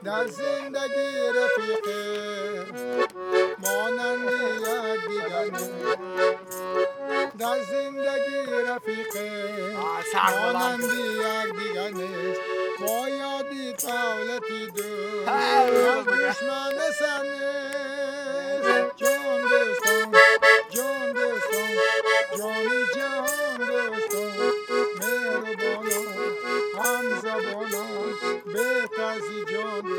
Music > Solo instrument
Street accordion sounds
Street musician in Tajikistan playing accordion Zoom H2N